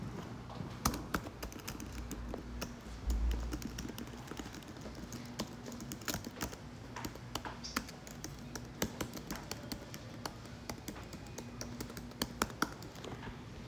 Sound effects > Natural elements and explosions
RAINInt rain from inside house DOI FCS2
rain from inside the house